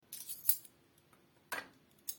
Sound effects > Objects / House appliances
Cutlery clinking, setting it down on the counter
fork, kitchen, knife, spoon, rummaging, metal, cook, cutlery